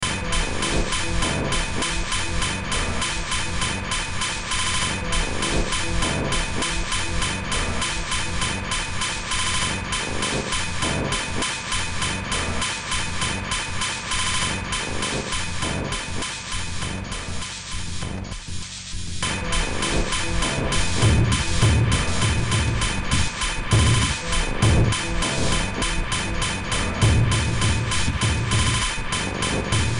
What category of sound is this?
Music > Multiple instruments